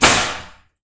Sound effects > Other mechanisms, engines, machines
TOOLPneu-Samsung Galaxy Smartphone Nail Gun, Burst 01 Nicholas Judy TDC
A nail gun burst. Long blast with roomy reverb and decay.
burst, long, Phone-recording, reverb, nail-gun